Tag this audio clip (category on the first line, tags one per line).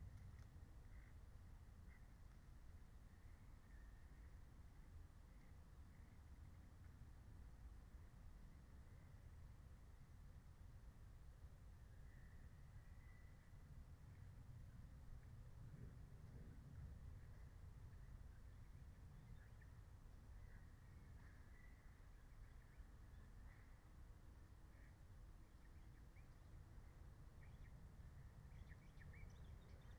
Soundscapes > Nature
meadow
alice-holt-forest
raspberry-pi
phenological-recording
soundscape
natural-soundscape
field-recording
nature